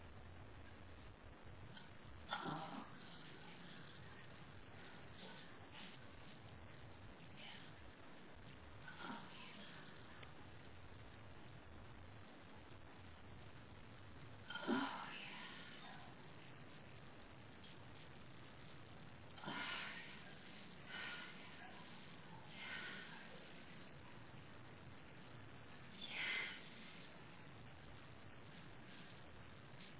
Sound effects > Human sounds and actions
Rachel's Hard at Work
The office was quiet, the kind of quiet that made the tick of a wall clock sound like a hammer. Afternoon sunlight poured through the blinds in narrow gold stripes, painting her desk in light and shadow. The hum of the city below was distant, like a forgotten memory. She leaned back in her chair, eyes closed, exhaling slowly. The tension of the day pooled at the base of her spine and radiated outward — dull, warm, insistent. The pressure behind her temples finally broke like a storm giving way to rain. A gasp escaped her, unbidden, as something deep inside her unclenched. The sound echoed softly off the glass partitions, curling around the edges of the room like smoke. Her breath caught, then came again — staggered, sharper. It was relief, release, a wild and private moment wrapped in steel and drywall. She didn’t move. Just let herself feel. The cool air kissed her skin; the polished desk beneath her hand felt like marble. Outside, someone coughed in a hallway.
solo, work, orgasm, vocal, female, public, moaning